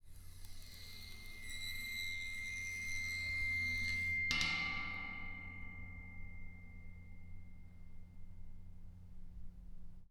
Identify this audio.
Sound effects > Other
Bowing metal stairs with cello bow 6
Bowing the metal part of the staircase in our apartment building. It's very resonant and creepy.
metal, horror, bow, scary, eerie, fx, atmospheric, effect